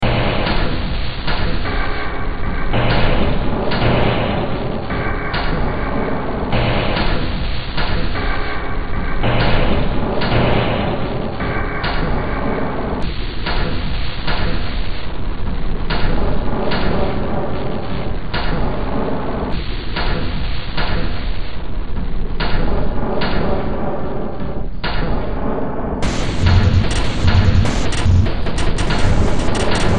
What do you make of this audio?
Music > Multiple instruments
Demo Track #3787 (Industraumatic)
Noise, Underground, Games, Cyberpunk, Ambient, Industrial, Horror, Sci-fi, Soundtrack